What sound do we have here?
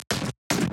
Sound effects > Experimental
destroyed glitchy impact fx -022
alien, hiphop, zap, whizz, crack, perc, glitchy, pop, experimental, clap, otherworldy, sfx, impacts, idm, lazer, edm, fx, glitch, impact, percussion, snap, abstract, laser